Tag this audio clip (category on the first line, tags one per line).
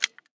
Sound effects > Human sounds and actions
click
off
toggle
button
activation
interface
switch